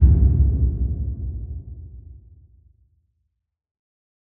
Sound effects > Electronic / Design
010 LOW IMPACT
BOOMY, IMPACT, DEEP, PUNCH, IMPACTS, LOW, RUMBLE, BASSY, BASS, RATTLING, HIT, BACKGROUND, RUMBLING, HITS